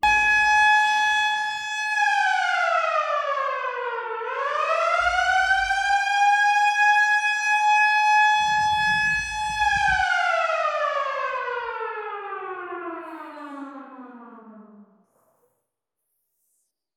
Sound effects > Other
Thai siren from a funeral
recorded october 20 2025
alarm, bangkok, eas, recording, siren, thai, thailand